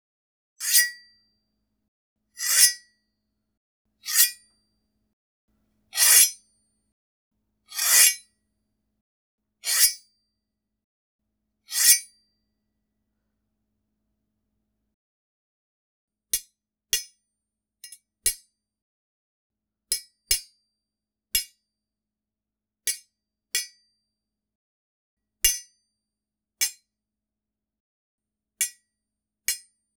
Sound effects > Objects / House appliances
dmc or hollywoodedge inspired shing ring and light sword hit sound 07282025
sounds of sword or knife shing slide with light hits. recorded from silverware fork and cleaver knife sounds.
blade, ding, fighting, hit, knife, light, medieval, metal, schwing, slide, sword, weapon